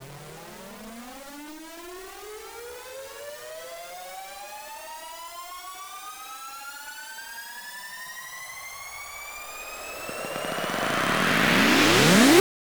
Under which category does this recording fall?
Instrument samples > Percussion